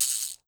Sound effects > Objects / House appliances
TOONMisc-Blue Snowball Microphone, CU Hand Buzzer Nicholas Judy TDC
A hand buzzer.
Blue-brand, Blue-Snowball, buzzer, cartoon, hand